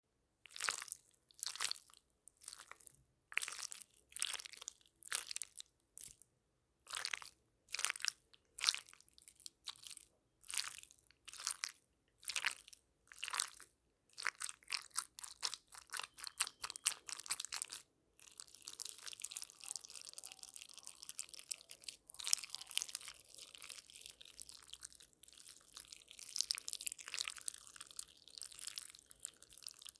Sound effects > Other
Wet-Slimy-Squishy-Goo-Limo-Organs-Blood footsteps-ambience-fast movement-slowmovement HIGH QUALITY AUDIO
I made this sound using some raw chicken in a bowl, pretty wired to make but here is it for y'all!